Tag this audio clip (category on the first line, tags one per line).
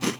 Sound effects > Human sounds and actions
bite
biting
bread
chew
chewing
closed-mouth
crisp
crispy
eat
eating
munch
munching
snack
snacking
taking-a-bite
toast